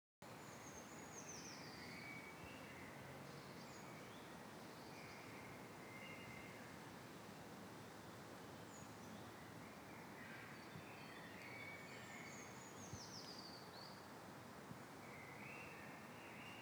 Soundscapes > Nature
Forest ambience 05
Stereo recording of forest with birds and cars in the background.
Bird, Birds, Birdsong, Day, Environment, Field-recording, Forest, Nature, Park, Peaceful, Traffic, Trees, Wind